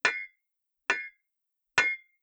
Sound effects > Other
3 Basic Metal Clangs Free
2 Metalic clangs of something banging against metal.